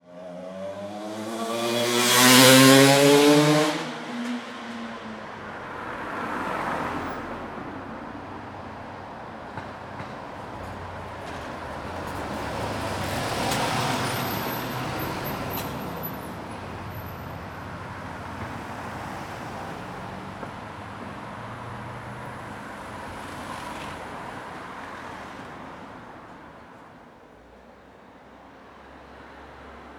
Soundscapes > Urban
Splott - Loud Scrambler Cars Lorries Footsteps - S Park Road Bridge
Recorded on a Zoom H4N.
fieldrecording, splott, wales